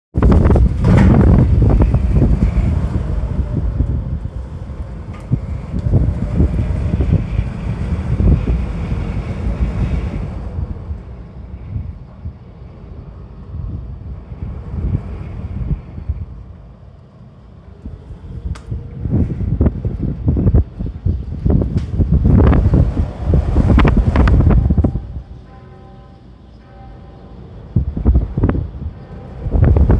Soundscapes > Urban
Wind and Bells on a Greek Island
Greek Island Wind and Bells- Tinos